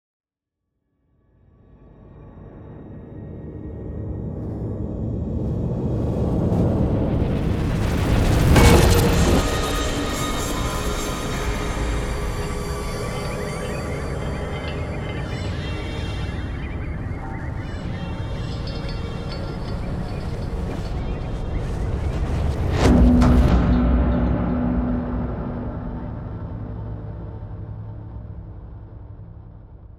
Sound effects > Other
Sound Design Elements SFX PS 087
A powerful and cinematic sound design elements , perfect for trailers, transitions, and dramatic moments. Effects recorded from the field. Recording gear-Tascam Portacapture x8 and Microphone - RØDE NTG5 Native Instruments Kontakt 8 REAPER DAW - audio processing
sweep, deep, transition, reveal, metal, hit, trailer, game, bass, impact, stinger, whoosh, explosion, boom, movement, sub, video, tension, riser, effect, cinematic, implosion, epic, industrial, indent